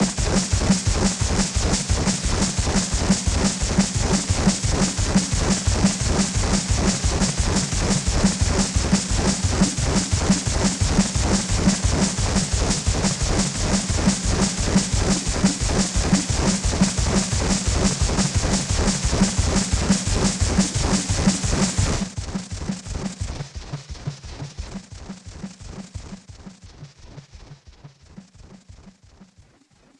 Instrument samples > Percussion
Simple Bass Drum and Snare Pattern with Weirdness Added 008
Bass-and-Snare, Bass-Drum, Experimental, Experimental-Production, Experiments-on-Drum-Beats, Experiments-on-Drum-Patterns, Four-Over-Four-Pattern, Fun, FX-Drum, FX-Drum-Pattern, FX-Drums, FX-Laden, FX-Laden-Simple-Drum-Pattern, Glitchy, Interesting-Results, Noisy, Silly, Simple-Drum-Pattern, Snare-Drum